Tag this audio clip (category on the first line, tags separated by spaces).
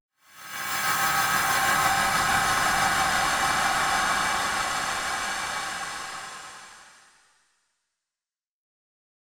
Sound effects > Electronic / Design
reverse shimmering slow